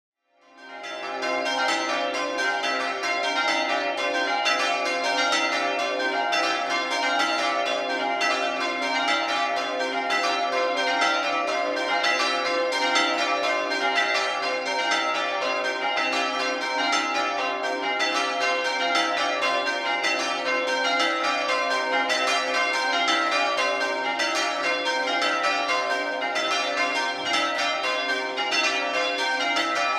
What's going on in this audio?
Soundscapes > Urban
A morning bell ringing recording of a local church. Edited in RX11.